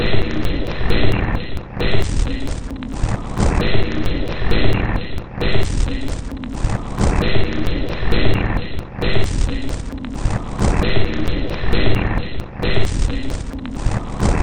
Instrument samples > Percussion
This 133bpm Drum Loop is good for composing Industrial/Electronic/Ambient songs or using as soundtrack to a sci-fi/suspense/horror indie game or short film.
Underground, Industrial, Loopable, Soundtrack, Drum, Ambient, Weird, Loop, Samples, Alien, Packs, Dark